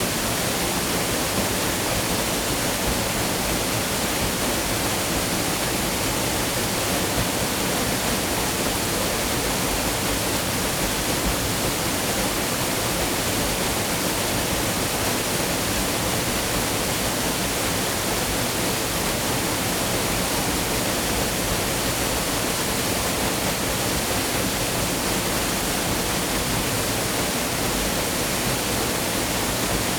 Soundscapes > Nature
First plunge pool at the Loup of Fintry, very strong and wide waterfall. Recorded in ORTF using Line Audio CM4's.
falls, field-recording, nature, river, stream, water, waterfall, waterfalls